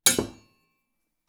Sound effects > Other mechanisms, engines, machines
Woodshop Foley-103
bam, bang, boom, bop, crackle, foley, fx, knock, little, metal, oneshot, perc, percussion, pop, rustle, sfx, shop, sound, strike, thud, tink, tools, wood